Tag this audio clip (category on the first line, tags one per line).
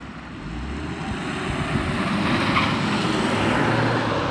Soundscapes > Urban
city
tyres
driving